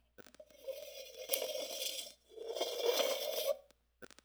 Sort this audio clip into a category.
Sound effects > Objects / House appliances